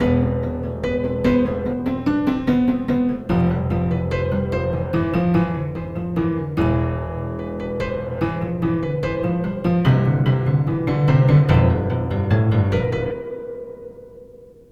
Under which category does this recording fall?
Music > Multiple instruments